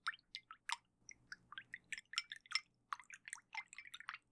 Sound effects > Objects / House appliances
A short recording of a mug of water being stirred by a wooden chopstick.